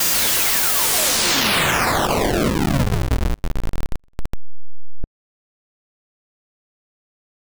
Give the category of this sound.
Sound effects > Electronic / Design